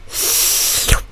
Sound effects > Human sounds and actions
It's just me recording my own licking sound. Thanks!